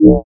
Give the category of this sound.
Instrument samples > Synths / Electronic